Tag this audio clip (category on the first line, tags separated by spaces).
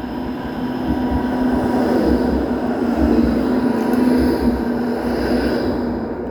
Sound effects > Vehicles
embedded-track tram moderate-speed